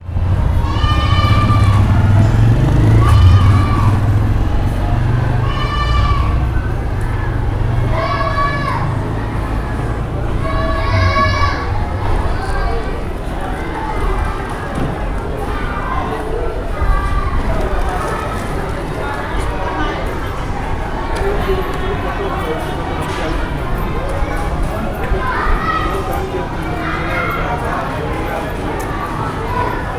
Soundscapes > Urban
In front of a school in Zanzibar City
School break in Zanzibar City. Many schoolchildren are calling out at once, the busy street can be heard, and it is windy. Recorded with an Olympus LS-14.
students, ambience, people, atmos, Africa, city, street, traffic, field-recording, school, cars, atmosphere